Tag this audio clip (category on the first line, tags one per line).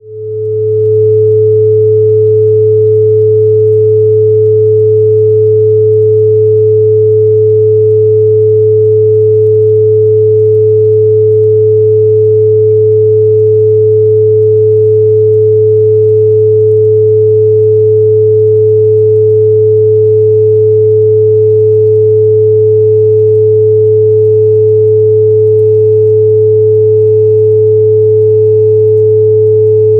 Soundscapes > Synthetic / Artificial
30min
432
432hz
atmosphere
background
drone
meditation
spirituality
tone